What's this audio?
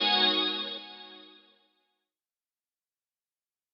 Music > Multiple instruments
Healing 6 (Obsidian Tranquillity)
rpg-restore, drink-potion, heal-effect, restoration-spell, heal-fx, heal-spell, healing-spell, restore-jingle, rpg-heal, healing-jingle, healing-effect, heal-character, rpg-fx, use-item, heal, restore-health, heal-jingle, healing, restore-spell, dylan-kelk, health-restore, restoration-jingle